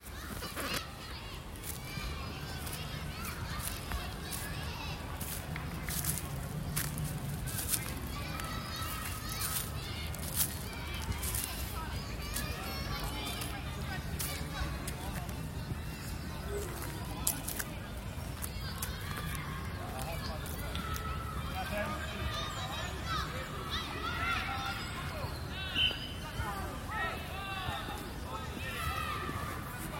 Soundscapes > Other
2025 OCT-Kids Football- london holland park saturday
it was recorded in Holland Park in London in October 2025. it was one fine day.
2025, field-recording, football, hollandpark, kids, london, october, saturday, shouting, soccer